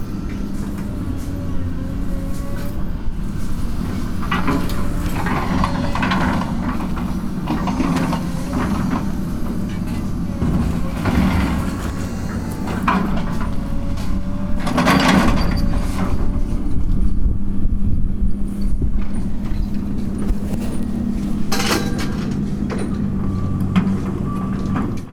Sound effects > Objects / House appliances
Junkyard Foley and FX Percs (Metal, Clanks, Scrapes, Bangs, Scrap, and Machines) 197
Ambience, Atmosphere, Bang, Bash, Clang, Clank, Dump, dumping, dumpster, Environment, Foley, FX, garbage, Junk, Junkyard, Machine, Metal, Metallic, Perc, Percussion, rattle, Robot, Robotic, rubbish, scrape, SFX, Smash, trash, tube, waste